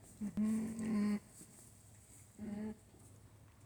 Sound effects > Animals
Miscellaneous Hoofstock - Alpaca; Two Moans, Close Perspective
An alpaca moans twice. Recorded with an LG Stylus 2022.